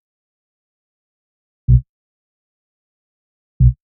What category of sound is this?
Instrument samples > Synths / Electronic